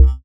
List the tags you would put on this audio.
Instrument samples > Synths / Electronic
additive-synthesis bass

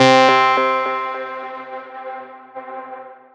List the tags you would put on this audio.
Instrument samples > Synths / Electronic
bass bassdrop clear drops lfo low lowend stabs sub subbass subs subwoofer synth synthbass wavetable wobble

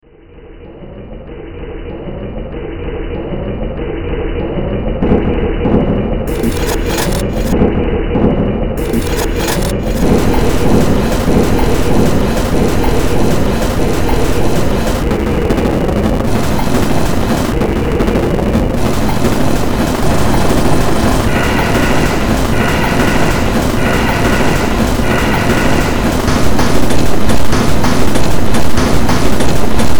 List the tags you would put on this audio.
Music > Multiple instruments
Cyberpunk Soundtrack Sci-fi Ambient Horror Noise Industrial Games Underground

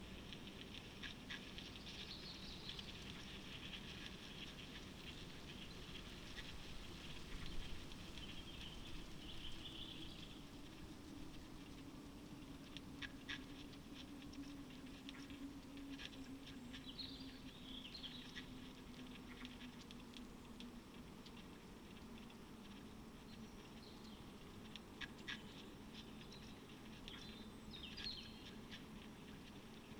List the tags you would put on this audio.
Soundscapes > Nature
artistic-intervention modified-soundscape soundscape sound-installation Dendrophone weather-data field-recording raspberry-pi natural-soundscape nature alice-holt-forest phenological-recording data-to-sound

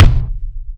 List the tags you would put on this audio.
Instrument samples > Percussion
attack bass bass-drum bassdrum beat death-metal drum drums fat-drum fatdrum fat-kick fatkick forcekick groovy headsound headwave hit kick mainkick metal natural Pearl percussion percussive pop rhythm rock thrash thrash-metal trigger

